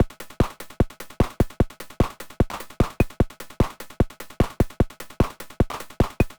Music > Solo percussion
150 BPM Sytrus Drum Beat
Drum created using only Sytrus from FL Studio. BPM: 150
bpm, 150, pattern, sytrus, drum, rhythm